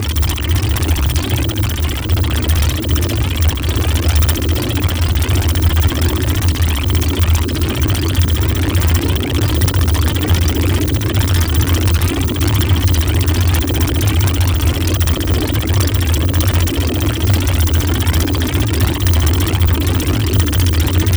Sound effects > Electronic / Design
RGS-Random Glitch Sound 10-Glitch Amniotic Fluid
It was retouched from a fail zaag kick that I made with 3xOsc , Waveshaper and ZL EQ. I just stretch and ring mod it so lot to see what will happen, then I get this sound. Processed with Vocodex and multiple Fracture.